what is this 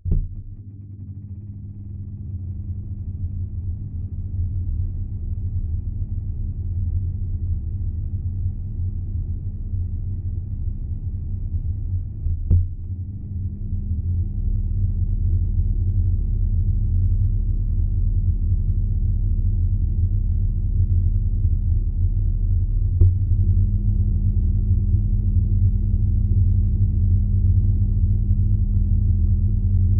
Sound effects > Objects / House appliances
Kitchen Rangehood fan turned on, up 2 speeds then turned off.
sfx, kitchen, fan, geophone, exhaust, rangehood